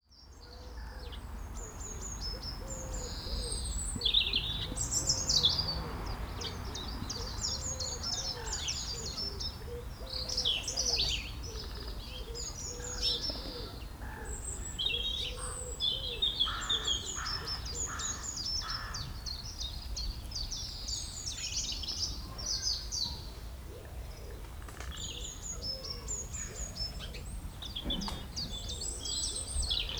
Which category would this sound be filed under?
Soundscapes > Urban